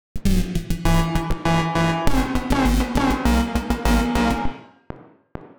Sound effects > Electronic / Design
Optical Theremin 6 Osc Shaper Infiltrated-013
DIY, Chaotic, Theremin, Crazy, Synth, Mechanical, Robot, Weird, IDM, Machine, FX, EDM, Oscillator, Electro, Electronic, Noise, Robotic, SFX, Otherworldly, Impulse, Saw, Tone, Loopable, strange, Gliltch, Experimental, Pulse, Analog, Alien